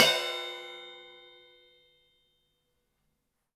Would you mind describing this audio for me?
Music > Solo instrument
Cymbal Grab Stop Mute-007
Crash, Custom, Cymbal, Cymbals, Drum, Drums, FX, GONG, Hat, Kit, Metal, Oneshot, Paiste, Perc, Percussion, Ride, Sabian